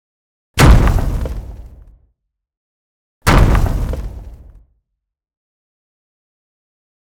Sound effects > Natural elements and explosions
medium rock boulder explosion sound 12182025
custom sounds of medium rock explosion sounds. can be used when giants or characters destroy buildings, concrete or brick walls.
rock, boulder, cannon, detonate, impact, break, choom, crack, demolition, attack, explosion, bomb, explosive, anime, blast, debris, fate, explode, boom, detonation, earth, destruction